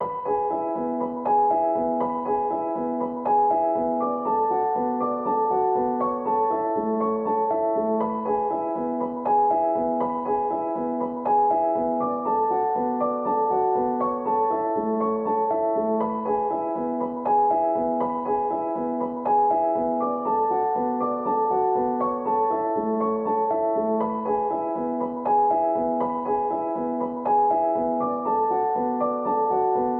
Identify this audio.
Music > Solo instrument
Piano loops 199 octave long loop 120 bpm
120, 120bpm, free, loop, music, piano, pianomusic, reverb, samples, simple, simplesamples